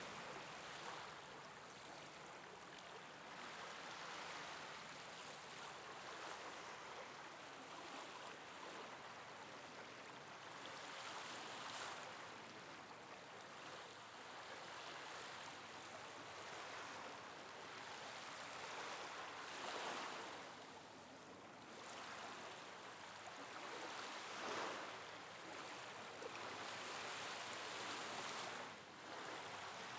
Soundscapes > Nature
Sea Recording X-Y
Zoom XYH-6 attached stereo X-Y microphone 120 degrees recording.
AMBIENCE, CALM, OUTDOOR, SEA